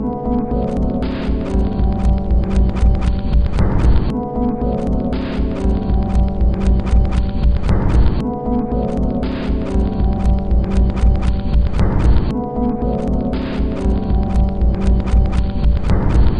Instrument samples > Percussion
This 117bpm Drum Loop is good for composing Industrial/Electronic/Ambient songs or using as soundtrack to a sci-fi/suspense/horror indie game or short film.
Packs, Dark, Loopable, Industrial, Weird, Loop, Underground, Soundtrack, Samples, Ambient, Alien, Drum